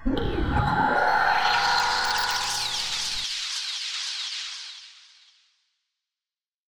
Sound effects > Experimental
Creature Monster Alien Vocal FX-4
Alien, Animal, boss, Creature, Deep, demon, devil, Echo, evil, Fantasy, Frightening, fx, gamedesign, Groan, Growl, gutteral, Monster, Monstrous, Ominous, Otherworldly, Reverberating, scary, sfx, Snarl, Snarling, Sound, Sounddesign, visceral, Vocal, Vox